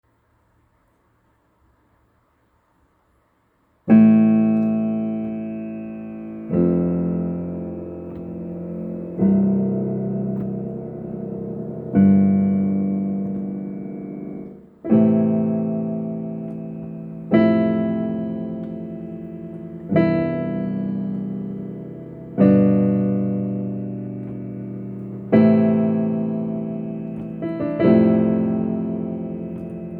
Music > Solo instrument
TheEternalLighthouse - a soft slow piano with basic chords and Rythm for my book promo video
A haunting, emotional piano piece composed for the book trailer of The Eternal Lighthouse. Written in A minor, the melody blends gentle arpeggios and rising chords to evoke themes of love, loss, and hope in a coastal, fog-shrouded world. Perfect for use in cinematic trailers, emotional storytelling, romantic drama, or atmospheric scenes.
ocean, love, trailer, story, ambient, dramatic, lighthouse, book, soft, soundtrack, piano, A, romantic, theme, cinematic, score, hopeful, emotional, composition, melancholy, haunting, music, minor, original, fog